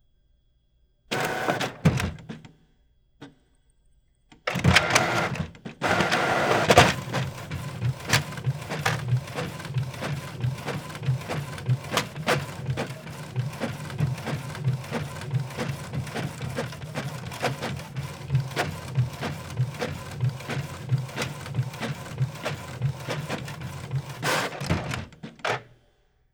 Sound effects > Objects / House appliances
Recording of my printer printing. It could be in an office. It could be at a school. It could be a high-tech sci-fi machine. Up to you! Have fun! Recorded on Zoom H6 and Rode Audio Technica Shotgun Mic.